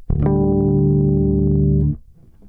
Music > Solo instrument
harmonic chord 1
pick, rock, bass, pluck, slide, chuny, slides, bassline, blues, riffs, fuzz, electric, basslines, note, slap, notes, chords, harmonic, electricbass, harmonics, low, funk, lowend, riff